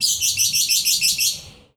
Sound effects > Animals
Bird Freaking Out
Making assumptions about a caged bird, recorded Jan 28, 2025 at the Affandi Museum in Yogyakarta (aka Yogya, Jogjakarta, Jogja) using a Moto G34, cleaned up in RX and Audacity.
alarm, alarming, birb, bird, bird-chirp, bird-chirping, birdie, call, calling, chirp, chirping, indonesia, isolated, little-bird, short, signal, single, siren